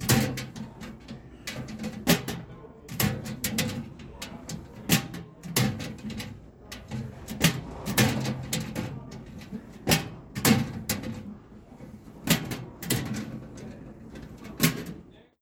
Sound effects > Objects / House appliances
DOORCab-Samsung Galaxy Smartphone, CU Metal Mailbox, Open, Close 01 Nicholas Judy TDC
A metal mailbox opening and closing. Recorded at Lowe's.
foley, mailbox, Phone-recording, metal, open, close